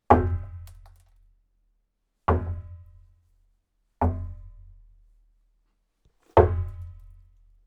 Sound effects > Objects / House appliances
Plastic bassin hand hit
Subject : Hitting a large plastic bucket / bassin by hand. Date YMD : 2025 04 Location : Indoor Gergueil France. Hardware : Tascam FR-AV2, Rode NT5 Weather : Processing : Trimmed and Normalized in Audacity.